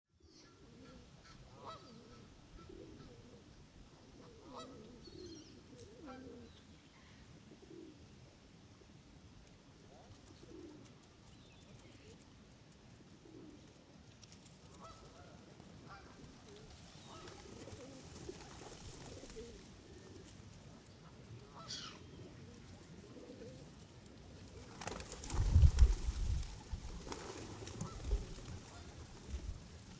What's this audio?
Soundscapes > Nature
Ambisonics Field Recording converted to B-Format. Information about Microphone and Recording Location in the title.